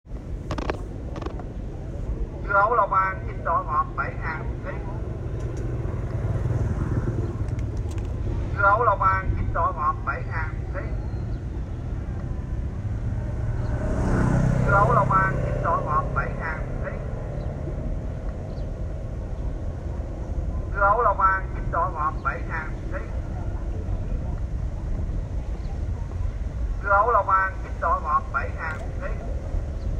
Speech > Solo speech
Dứa Hấu Long An Chính Đỏ Ngọt 7 Ngàn 1 Ký
Man sell water melon. Record use iPhone 7 Plus smart phone. 2024.11.28 07:33
business, sell, man, viet, fruit, voice, male